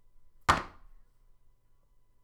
Sound effects > Objects / House appliances
An Iphone hastily dropped on a wooden desk from one inch above. Single hit. Recorded with a blue snow ball microphone.